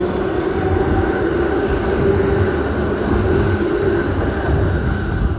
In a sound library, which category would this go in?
Sound effects > Vehicles